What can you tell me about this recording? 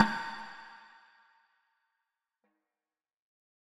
Music > Solo percussion
Snare Processed - Oneshot 236 - 14 by 6.5 inch Brass Ludwig
hits, beat, drum, oneshot, rimshot, snareroll, snaredrum, processed, reverb, crack, snares